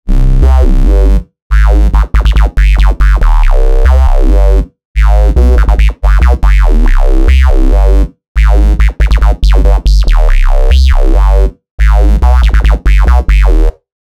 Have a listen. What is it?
Music > Solo instrument
synth bass loop acid funk Cmin 140
funky bass made with ableton suite's 'Bass' instrument. C minor. 140bpm.
140, acid, bass, colorado, dubstep, griz, synth, thick